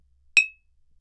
Sound effects > Objects / House appliances
Bottle Clink 2
sound of two glass bottle being tapped together, recorded with sure sm57 into adobe audition for a university project
glass, oneshot